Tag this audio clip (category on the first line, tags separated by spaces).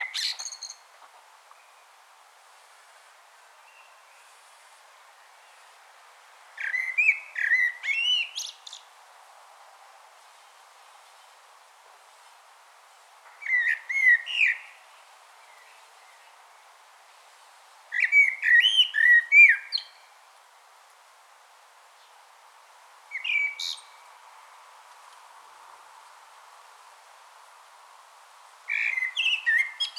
Soundscapes > Nature
urban-garden birdsong blackbird bird birds spring field-recording nature